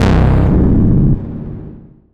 Instrument samples > Synths / Electronic
CVLT BASS 96
bass, bassdrop, clear, drops, lfo, low, lowend, stabs, sub, subbass, subs, subwoofer, synth, synthbass, wavetable, wobble